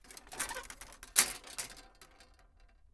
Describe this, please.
Sound effects > Other mechanisms, engines, machines
metal shop foley -094
bam, bang, boom, bop, crackle, foley, fx, knock, little, metal, oneshot, perc, percussion, pop, rustle, sfx, shop, sound, strike, thud, tink, tools, wood